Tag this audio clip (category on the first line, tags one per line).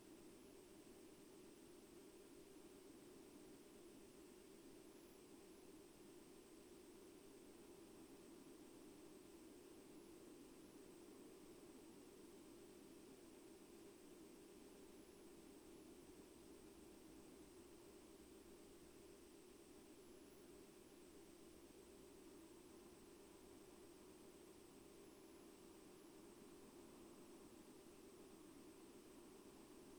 Soundscapes > Nature
artistic-intervention
sound-installation
raspberry-pi
nature
weather-data
field-recording
natural-soundscape
alice-holt-forest
soundscape
phenological-recording
Dendrophone
data-to-sound
modified-soundscape